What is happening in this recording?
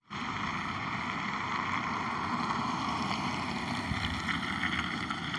Sound effects > Vehicles
car passing 9
car, drive